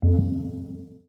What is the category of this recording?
Sound effects > Electronic / Design